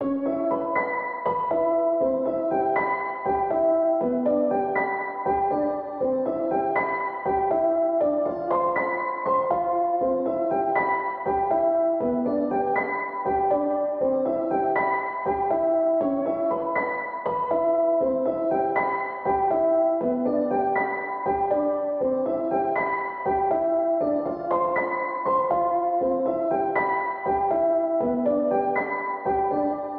Music > Solo instrument
Piano loops 115 efect 4 octave long loop 120 bpm
loop, music, 120bpm, samples, simple, simplesamples, piano, 120, free, reverb, pianomusic